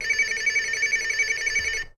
Sound effects > Objects / House appliances
COMTelph-Blue Snowball Microphone Nick Talk Blaster-Telephone, Ringing, Electronic Nicholas Judy TDC
An electronic telephone ringing. Recorded from a Nickelodeon Talk Blaster.
Blue-brand, Blue-Snowball, electronic, ring, telephone